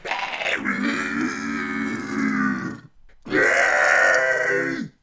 Sound effects > Human sounds and actions
Decided to do some more zombie sounds! Just remember to give me a credit and all is good.